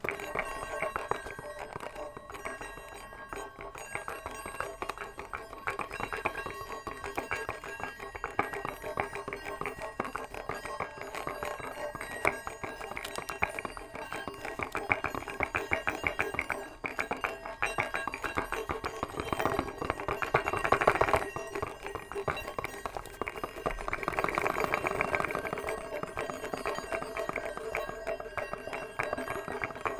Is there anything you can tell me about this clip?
Sound effects > Objects / House appliances
A chinese health balls box shaking and chiming.